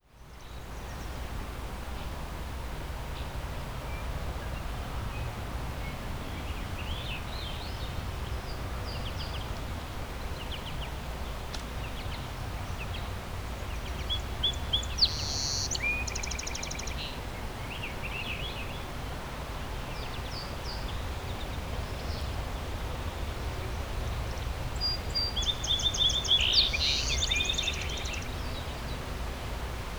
Soundscapes > Nature
Birds sing as the wind shuffles and sweeps through the reed canary grass. Insects and cars pass by.